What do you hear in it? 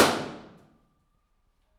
Soundscapes > Urban
Roomy vibe balloon pop